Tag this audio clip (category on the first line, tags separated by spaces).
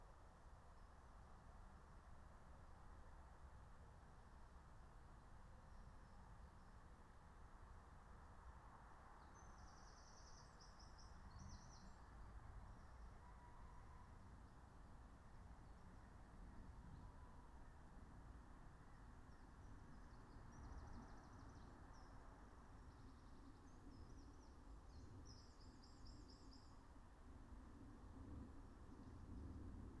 Soundscapes > Nature
natural-soundscape soundscape alice-holt-forest raspberry-pi nature meadow field-recording phenological-recording